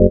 Instrument samples > Synths / Electronic
WHYBASS 8 Gb
additive-synthesis, bass, fm-synthesis